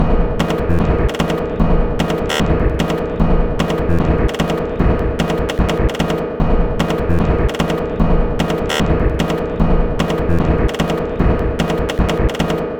Instrument samples > Percussion
This 150bpm Drum Loop is good for composing Industrial/Electronic/Ambient songs or using as soundtrack to a sci-fi/suspense/horror indie game or short film.
Loopable, Loop, Dark, Soundtrack, Industrial, Ambient, Underground, Alien, Samples, Drum, Weird, Packs